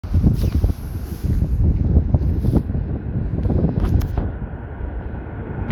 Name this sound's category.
Soundscapes > Urban